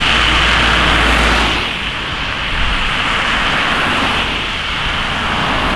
Sound effects > Vehicles
Car00058080CarMultiplePassing
Sound recording of cars driving on a road, with quick succession of multiple cars being heard one after the other. The recording was made on a rainy, winter day. The segment of the road the recording was made at was in an urban environment without crosswalks or streetlights. Recorded at Tampere, Hervanta. The recording was done using the Rode VideoMic.